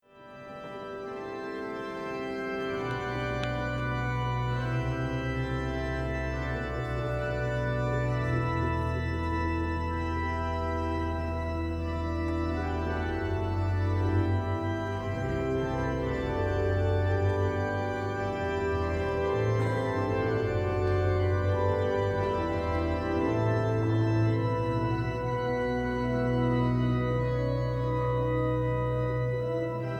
Music > Solo instrument
001A 100111 0057 FR Music Holy Mass La Lucerne
Music from a Holy Mass in La Lucerne Abbey (1st file). Please note that this audio file has kindly been recorded by Dominique LUCE, who is a photographer. Fade in/out applied in Audacity.